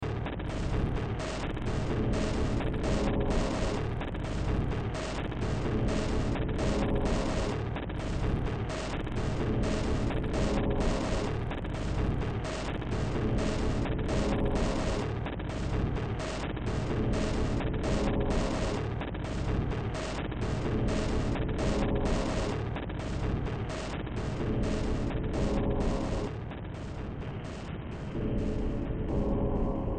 Music > Multiple instruments
Demo Track #3000 (Industraumatic)
Cyberpunk, Ambient, Industrial, Games, Soundtrack, Noise, Underground, Sci-fi, Horror